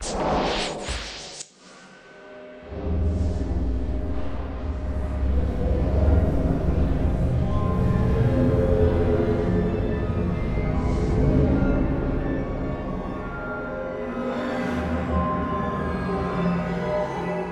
Soundscapes > Synthetic / Artificial
Sonido creado con sintetizador que da impresión de estar en las profundidades de una caverna artificial. A synthesizer-created sound that gives the impression of being in the depths of an artificial cavern.
depth, mystery, synth